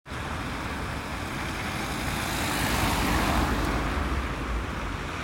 Sound effects > Vehicles
A car passing by from distance near insinnöörinkatu 60 road, Hervanta aera. Recorded in November's afternoon with iphone 15 pro max. Road is wet.
rain, tampere, vehicle